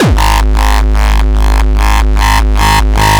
Instrument samples > Percussion
Funny Kick 3 #G
A stupid kick synthed with phaseplant only.
Dance, Funny, Happy, Hardcore, Hardstyle, HDM, Kick, Party, rawstyle, Zaag, Zaagkick